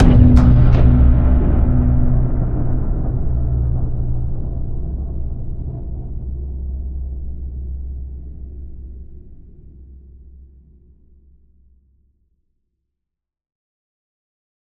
Sound effects > Other
Sound Design Elements Impact SFX PS 122
All samples used in the production of this sound effect are field recordings that I recorded myself. I mixed the field samples with samples designed in the ASM Hydrasynth Deluxe synthesizer. Field recording equipment: Tascam Portacapture x8 and microphone: RØDE NTG5. Samples of various kick types recorded by me and samples from the ASM Hydrasynth Deluxe were layered in Native Instruments Kontakt 8, and then final audio processing was performed in REAPER DAW.